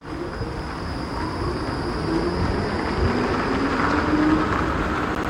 Sound effects > Vehicles
Tram sound
rain, Pro, city, 15, Tram, mics, light, iPhone